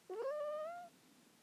Sound effects > Animals
The kitten makes a pleasant sound. Recorded on the phone.
cat, animal